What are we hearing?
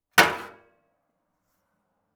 Sound effects > Other mechanisms, engines, machines
Woodshop Foley-096
bam, bang, boom, bop, crackle, foley, fx, knock, little, metal, oneshot, perc, percussion, pop, rustle, sfx, shop, sound, strike, thud, tink, tools, wood